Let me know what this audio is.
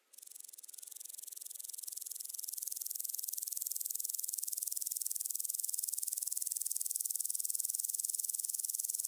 Sound effects > Animals
Grasshopper close up recording
Recorded with TASCAM DR100mk2 +rode stereo mic. in british park
ambience, crick, field, general-noise, soundscape